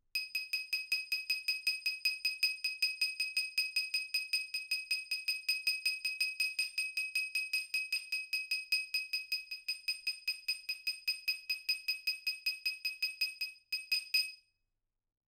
Sound effects > Other
Glass applause 26
applause, cling, clinging, FR-AV2, glass, individual, indoor, NT5, person, Rode, single, solo-crowd, stemware, Tascam, wine-glass, XY